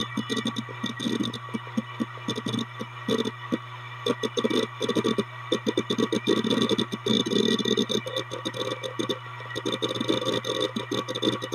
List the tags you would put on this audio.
Sound effects > Other mechanisms, engines, machines
load
write
disk
read
seagate
drive
hdd
hard

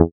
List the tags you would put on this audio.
Instrument samples > Synths / Electronic
fm-synthesis
additive-synthesis
bass

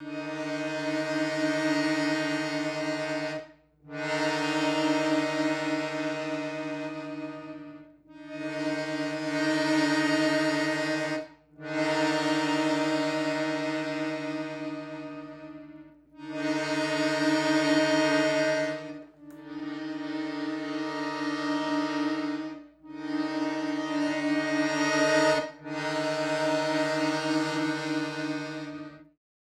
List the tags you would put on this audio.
Instrument samples > Other
accordion
dread
wobble

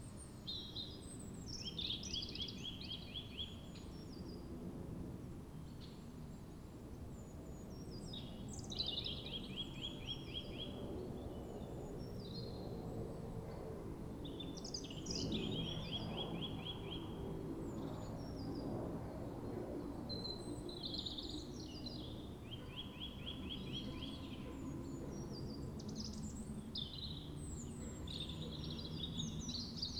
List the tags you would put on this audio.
Soundscapes > Nature
alice-holt-forest
artistic-intervention
data-to-sound
field-recording
modified-soundscape
natural-soundscape
nature
phenological-recording
raspberry-pi
sound-installation
soundscape
weather-data

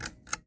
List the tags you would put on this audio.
Sound effects > Other mechanisms, engines, machines
switch
lamp
foley
Phone-recording
lamp-switch
chain
pull
pull-chain

